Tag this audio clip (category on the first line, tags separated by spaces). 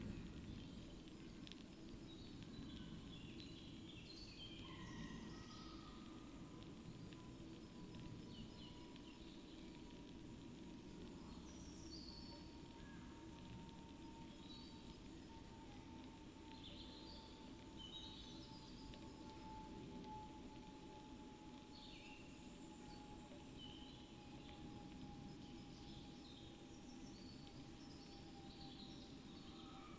Soundscapes > Nature
modified-soundscape nature alice-holt-forest Dendrophone data-to-sound field-recording soundscape weather-data artistic-intervention natural-soundscape sound-installation raspberry-pi phenological-recording